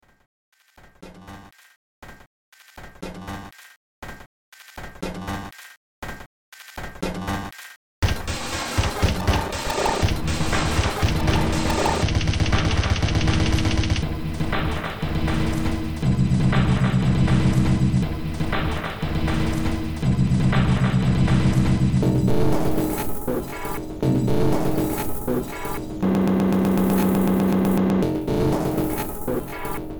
Music > Multiple instruments
Demo Track #3815 (Industraumatic)
Horror, Noise, Ambient, Industrial, Sci-fi, Soundtrack, Underground, Cyberpunk, Games